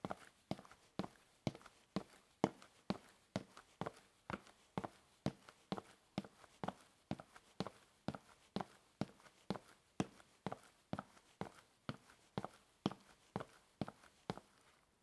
Sound effects > Human sounds and actions
footsteps, tile, fast walk
tile, foley